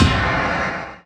Instrument samples > Percussion
crash bass 1 long
A mix/blend of low-pitched older Zildjian ride and crash files. The result is bad but it sounds good as backing drums for deep layering. Your main drums must be realistic for typical rock and metal music.
China, Chinacrash, crash, crashes, cymbal, drums, grill, grillfall, lash, Meinl, metal, metallic, Paiste, percussion, Sabian, sinocymbal, steamcrash, Zildjian